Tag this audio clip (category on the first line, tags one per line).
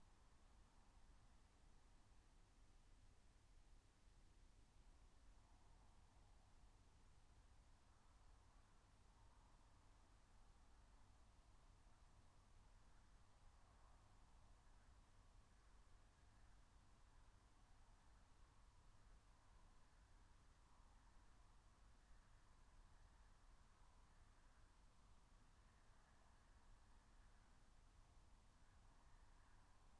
Soundscapes > Nature
meadow
alice-holt-forest
soundscape
phenological-recording
raspberry-pi
natural-soundscape
field-recording
nature